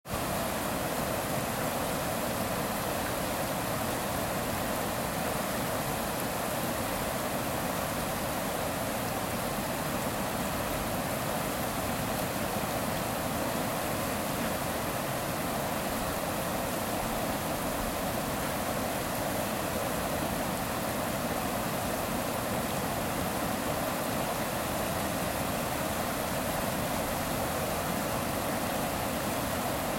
Soundscapes > Nature
Recorded along the Deschutes Rive in Bend, Oregon August 2025 using Iphone XR Memo app.